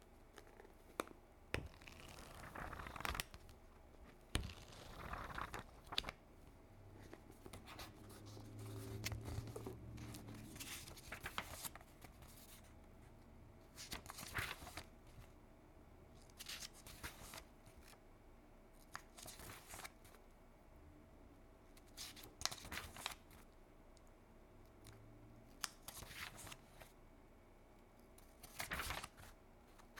Sound effects > Objects / House appliances
Softcover Pages
I turn and flip through the pages of a softcover book